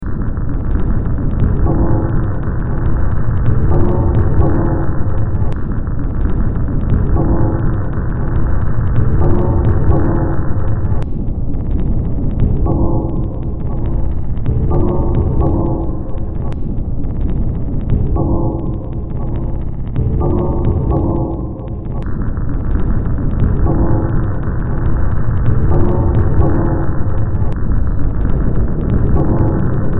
Music > Multiple instruments
Demo Track #2939 (Industraumatic)
Ambient, Cyberpunk, Games, Horror, Industrial, Noise, Sci-fi, Soundtrack, Underground